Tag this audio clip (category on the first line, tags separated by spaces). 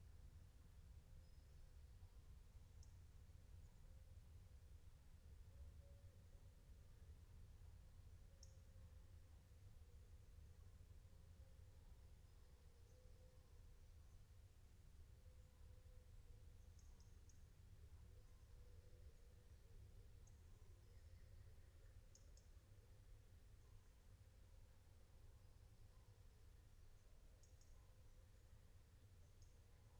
Soundscapes > Nature
raspberry-pi field-recording soundscape phenological-recording artistic-intervention weather-data Dendrophone modified-soundscape sound-installation natural-soundscape nature data-to-sound alice-holt-forest